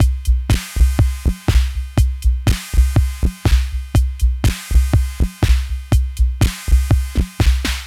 Music > Solo percussion
122 606Mod Loop 05
606, DrumMachine, Electronic, Loop, Mod, music, Synth, Vintage